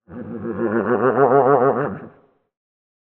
Sound effects > Animals
Eldritch Whinny
lovecraftian-entity, eldritch-monster, lovecraftian-monster, strange-neigh, strange-creature, eldritch-vocalizations, strange-whinny, lovecraftian, strange-entity, lovecraftian-creature, eldritch-neigh, deep-one, horror-monster, eldritch, horror-creature, eldritch-monster-cry